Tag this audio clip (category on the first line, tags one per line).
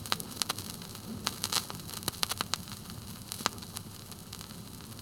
Sound effects > Objects / House appliances
noise
groove
Blue-brand
loop
Blue-Snowball
record